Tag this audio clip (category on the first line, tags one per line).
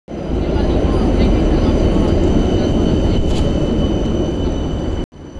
Sound effects > Vehicles
rail
tram
vehicle